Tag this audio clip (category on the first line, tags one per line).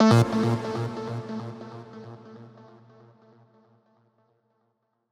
Music > Other
audacity
flstudio
DUNE3